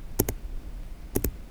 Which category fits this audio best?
Sound effects > Objects / House appliances